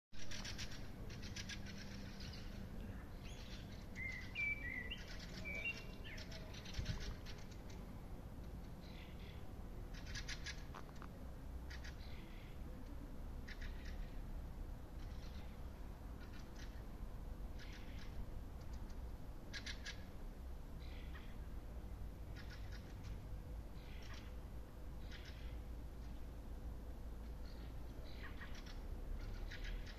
Sound effects > Animals
A peaceful ambient field recording of birds singing in a quiet urban garden, captured in stereo. Recorded in early spring using a mobile phone microphone. Ideal for use in meditation, ambient soundtracks, background layering, or nature projects. No processing or effects applied – natural, unedited soundscape.